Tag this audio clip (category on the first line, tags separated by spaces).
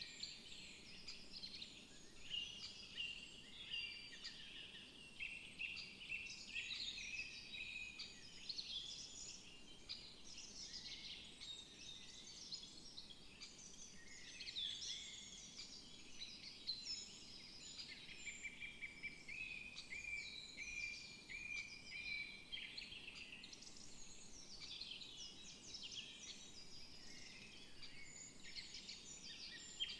Soundscapes > Nature
raspberry-pi data-to-sound alice-holt-forest weather-data modified-soundscape Dendrophone sound-installation artistic-intervention phenological-recording natural-soundscape nature field-recording soundscape